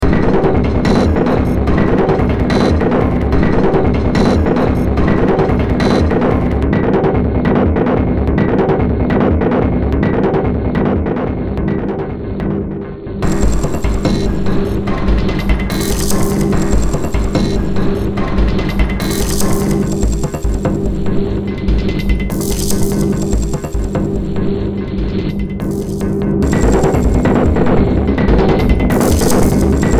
Music > Multiple instruments
Short Track #3125 (Industraumatic)
Industrial, Sci-fi, Underground, Games, Horror, Ambient, Noise, Cyberpunk, Soundtrack